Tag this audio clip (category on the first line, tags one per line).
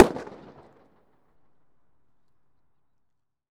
Sound effects > Natural elements and explosions
32
32float
Balloon
Data
float
High
Impulse
IR
Measuring
Pop
Quality
Response